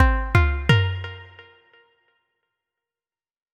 Sound effects > Other
Achievement (Synpluck RM edition)
Achievement, Game, Synpluck